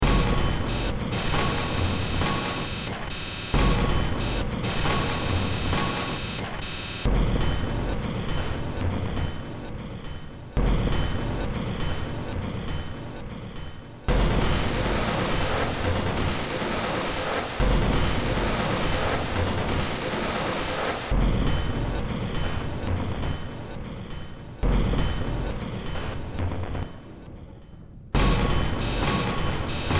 Music > Multiple instruments
Short Track #3158 (Industraumatic)
Ambient, Cyberpunk, Games, Horror, Industrial, Noise, Sci-fi, Soundtrack, Underground